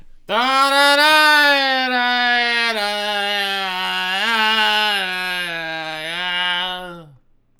Sound effects > Human sounds and actions
drunk mumble
drunk, man, male, voice